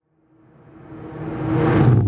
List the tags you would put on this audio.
Sound effects > Electronic / Design
exclamation reverse-recording trigger

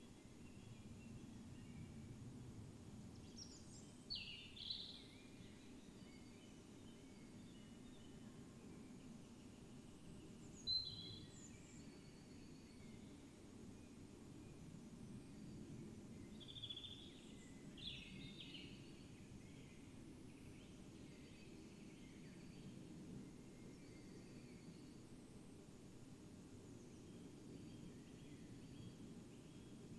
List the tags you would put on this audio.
Soundscapes > Nature
alice-holt-forest data-to-sound Dendrophone natural-soundscape nature weather-data

